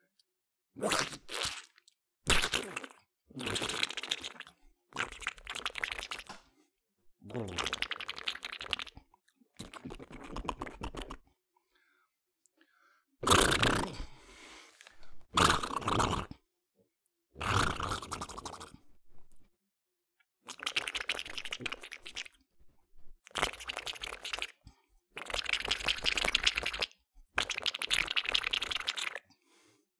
Sound effects > Human sounds and actions
Technically NSFW. A gross series of sounds created to accentuate a joke involving the lips of a vagina's labia flapping very loudly. Could be used for any manner of weird moments, like someone digging their face into a pie or something else that produces wet, motorboating sounds.